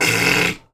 Sound effects > Human sounds and actions
TOONVox-Blue Snowball Microphone, CU Vocal Buzzer, Raspy Nicholas Judy TDC
A raspy vocal buzzer.
buzzer, vocal